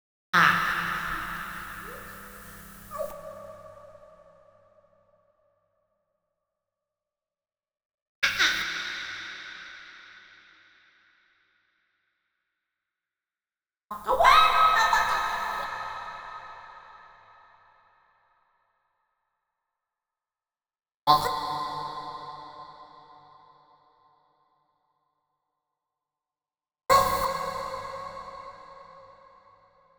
Speech > Other
A sequence of strange and slightly comical studio vocal shots by Kait Ryan recorded in Studio CVLT, Arcata, Ca, Humboldt redwoods through an Audiofuse interface with a Sure Beta58 and further processed in Reaper using the wonderful Raum reverb VST. Enjoy~